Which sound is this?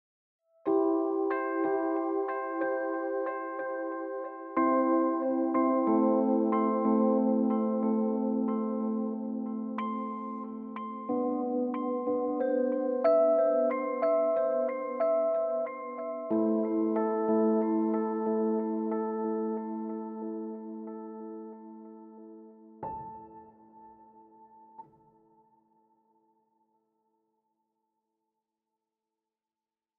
Music > Multiple instruments
lofi - Hope 3
a short piece of an lofi track, created in ableton, using some rhodes and piano, keeping it simple and clean